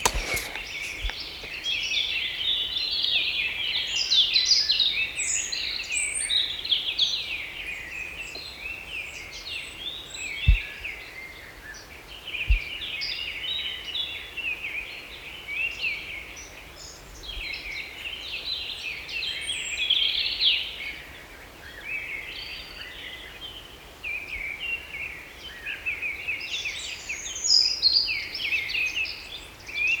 Soundscapes > Nature
Subject : Field recording in the middle of the woods while I was emptying a SD card of my H2N recorder. Date YMD : 2025 04 18 16H52 Location : Gergueil France. Hardware : Macbook Air M2 Weather : Half half cloudy and clear sky. Processing : Trimmed and Normalized in Audacity. Probably some fade in/out.